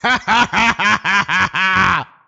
Sound effects > Human sounds and actions
Pally Unhinged Laugh
I have been unable to re-produce this laugh. I let out this completely unhinged laugh. Please enjoy.
Evil, Horror, Laugh, Unhinged